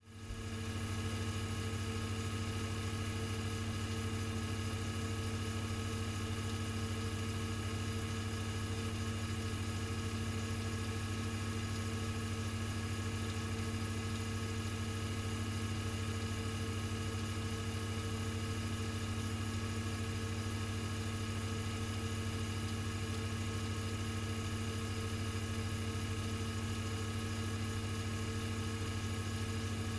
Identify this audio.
Sound effects > Other mechanisms, engines, machines
Refrigerator. technical noise
Recorded that sound by myself with Recorder H1 Essential
Ambient, Refrigerator, Room, technical